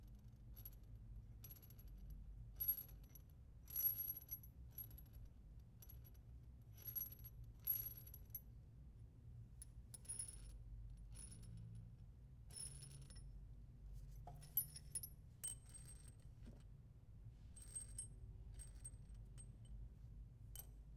Sound effects > Objects / House appliances
Rolling large, rusty nails, individually on a cold floor. Recorded with Zoom H2.